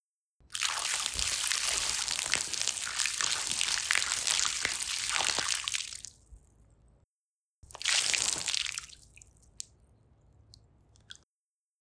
Sound effects > Natural elements and explosions
Water - Pour above a puddle
Pour liquid over a puddle. * No background noise. * No reverb nor echo. * Clean sound, close range. Recorded with Iphone or Thomann micro t.bone SC 420.
basin, bath, bathroom, bowl, caraf, container, crockery, dish, dishes, empty, emptying, glass, gurgle, jug, libation, liquid, pitcher, plants, pot, pots, pour, pouring, puddle, splash, tableware, wash, washing, water, watering